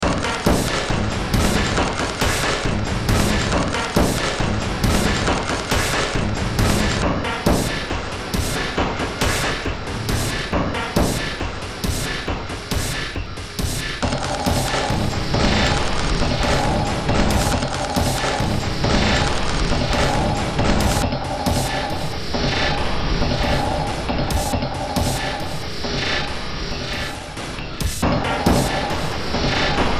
Music > Multiple instruments
Short Track #3680 (Industraumatic)
Cyberpunk, Horror, Underground, Sci-fi, Noise, Games, Soundtrack, Industrial, Ambient